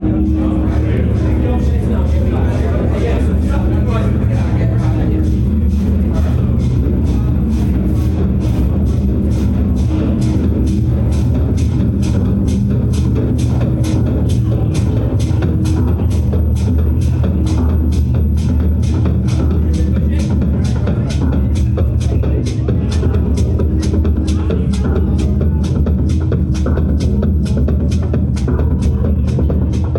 Music > Other
Tresor nightclub. The home of Techno EDM